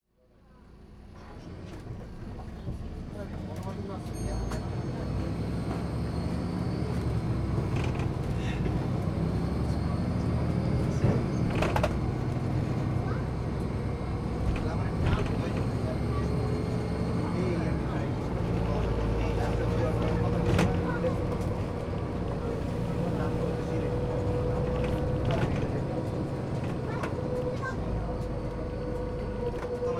Soundscapes > Urban
amb bus roma italy kengwai cct
Journey on a bus in Rome. Recorded with a Zoom H1n, low-cut at 80 Hz. 22/10/2025 – 3:00 PM